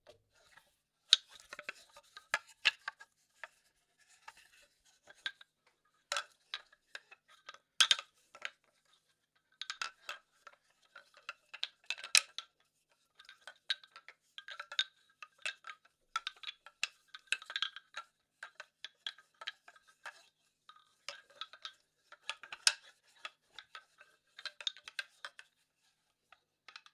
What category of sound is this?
Sound effects > Natural elements and explosions